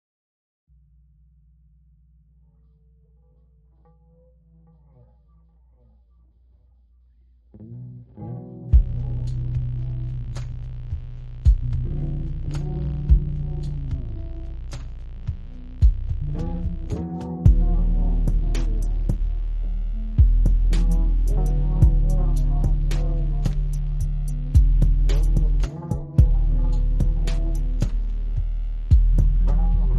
Music > Multiple instruments
Instrumental by AMK5 Made entirely on an old 🖥 iMac (20-inch, Mid 2007) Using GarageBand v10.2.0 The instruments and gear I used were: - Nashville Electric Guitar - Freedom Guitar Amp - U-Phoria UMC 22 interface Other loops I used: all from Garage Band. -Hard Ground Beat -Night Sky Beat -Incoming Sub Bass -Virtual Drummers: East Bay (Max), Coffee Shop (Finn), Studio (Quincy) øøøøøøø Prøduced by AMK5 for ʟꋖʀ ⋆ Ꮇ𝐯𝐬𝐢𝐜 Beatmaker based in Quito - Ecuador. At the start it is a calm soothing relaxing melody with guitar until the sub bass makes an entrance. Then the beat kicks in and the instrumental turns into a more rapid track, kind of trap , hip hop, rap vibes. The first 5 minutes serve as the preview of the entire composition. Make sure to mention AMK5 as the original beatmaker!